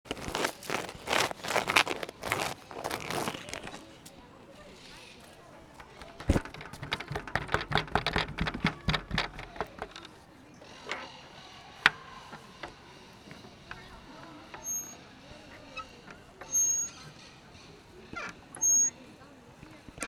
Soundscapes > Urban
20251118 CasaBloc HajraAllisonEsmeralda
Urban Ambience Recording in collab IE Tramunta, Barcelona, Novembre 2025. Using a Zoom H-1 Recorder. In the context of "Iteneraris KM.0" Project.
SoundMap, Ambience, Urban